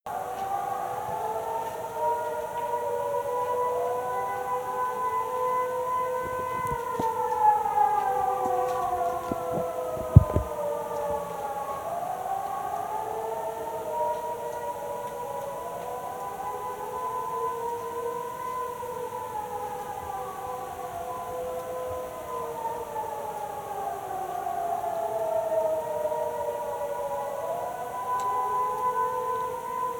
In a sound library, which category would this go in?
Soundscapes > Urban